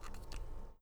Sound effects > Objects / House appliances
OBJWrite-Blue Snowball Microphone Pen, Top, Close Nicholas Judy TDC
A pen closing it's top.
foley, close, Blue-Snowball, Blue-brand, top, pen